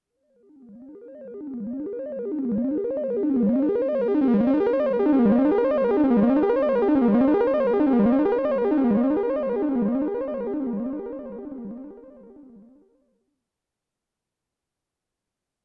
Sound effects > Electronic / Design
Analogue Station ID "Arpeggio"
created with my Arturia Microbrute. Inspired by the sound effects that accompanied early motion graphics. free to create your own animation for or to sample.
ident, synthesizer, analogue